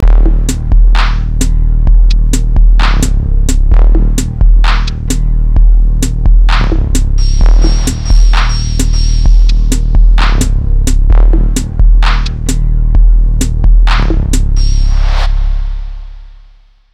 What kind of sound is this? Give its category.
Music > Multiple instruments